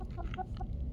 Sound effects > Animals
A chicken softly cooing recorded on my phone microphone the OnePlus 12R